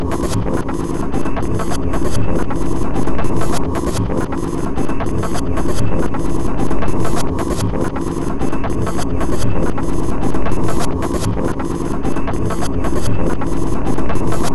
Instrument samples > Percussion
This 132bpm Drum Loop is good for composing Industrial/Electronic/Ambient songs or using as soundtrack to a sci-fi/suspense/horror indie game or short film.
Alien, Soundtrack, Samples, Packs, Drum, Ambient, Weird, Loop, Underground, Industrial, Loopable, Dark